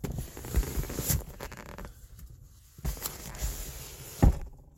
Sound effects > Vehicles
VEHMech-Samsung Galaxy Smartphone, CU Drink Cup Holder, Push In, Pull Out Nicholas Judy TDC
A drink cup holder pushing in and pulling out from car.